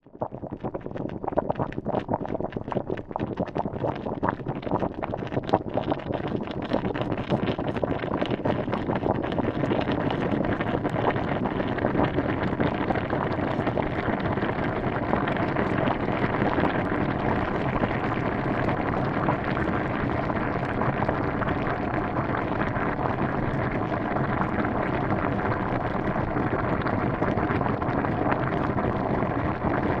Sound effects > Objects / House appliances
2. forma / shape
This sound has been edited and processed from the original recording.